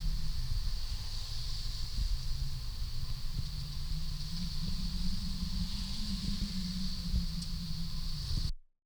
Sound effects > Natural elements and explosions
Rainy day through a tree using a contact microphone through a TASCAM DR-05X